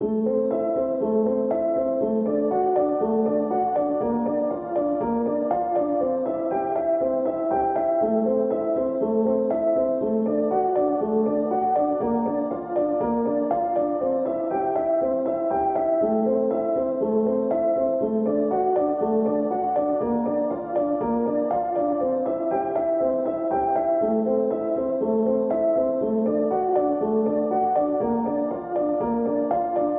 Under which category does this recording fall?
Music > Solo instrument